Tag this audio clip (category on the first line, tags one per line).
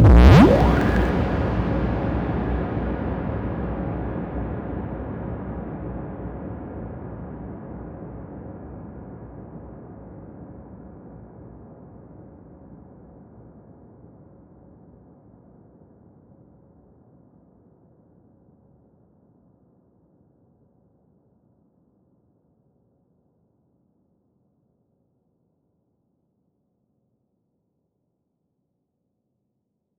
Sound effects > Other mechanisms, engines, machines
scare
startle
stinger